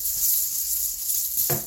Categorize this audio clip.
Sound effects > Objects / House appliances